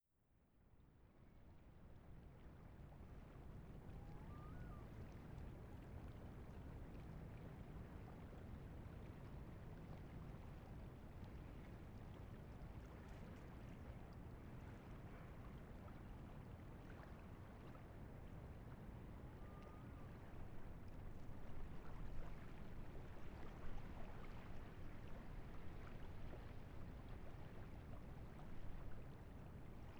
Soundscapes > Nature
life on the shoreline of the bay 2 - 12.25
An estuary soundscape. There is one loud bird close to the mic in the middle of the recording.
beach, birds, estuary, field-recording